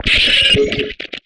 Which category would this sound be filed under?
Sound effects > Experimental